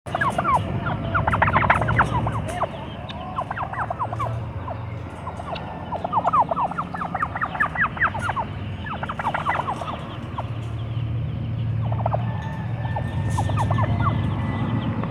Sound effects > Animals
Fowl - Turkey; Two Turkeys Yelping, Close Perspective
Two turkeys clucking or yelping.
barn, cluck, farm, poultry, turkey, yelp